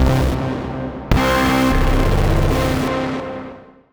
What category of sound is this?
Instrument samples > Synths / Electronic